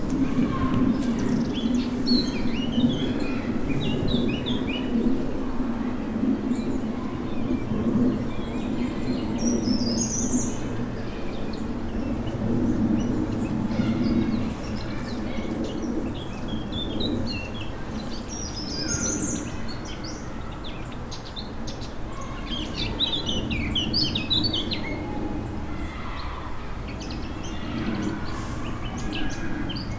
Soundscapes > Nature
20250312 Collserola Cadernera Wind Quiet
Wind, Quiet, Cadernera, Collserola